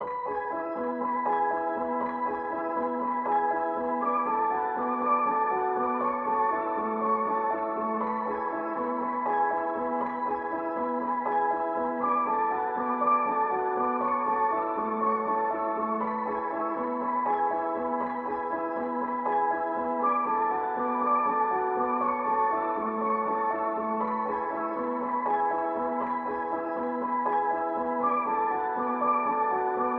Music > Solo instrument
Piano loops 199 efect 2 octave long loop 120 bpm
piano, simple, 120, free, samples, reverb, music, 120bpm, loop, pianomusic, simplesamples